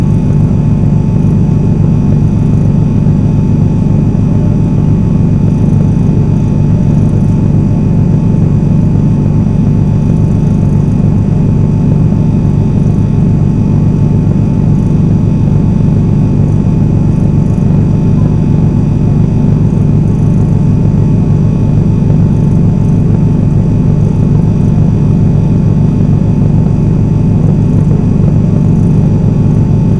Sound effects > Other mechanisms, engines, machines
Sound of working AC generator near street bar